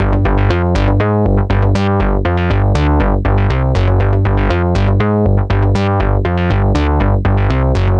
Music > Solo instrument
120 A# MC202-Bass 01
120bpm, 80s, Analog, Analogue, Bass, BassSynth, Electronic, Loop, Roland, Synth, SynthBass, Synthesizer, Vintage